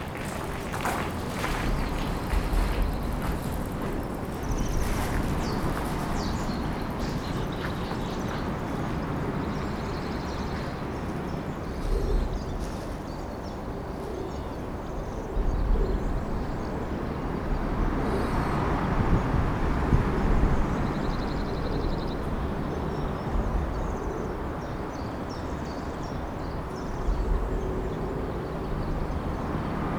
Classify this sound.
Soundscapes > Urban